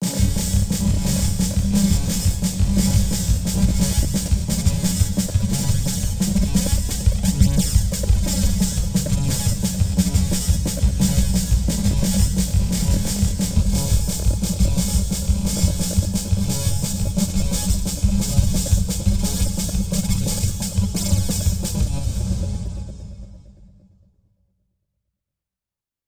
Instrument samples > Percussion
Simple Bass Drum and Snare Pattern with Weirdness Added 001
Bass-and-Snare, Bass-Drum, Experimental, Experimental-Production, Experiments-on-Drum-Beats, Experiments-on-Drum-Patterns, Four-Over-Four-Pattern, Fun, FX-Drum, FX-Drum-Pattern, FX-Drums, FX-Laden, FX-Laden-Simple-Drum-Pattern, Glitchy, Interesting-Results, Noisy, Silly, Simple-Drum-Pattern, Snare-Drum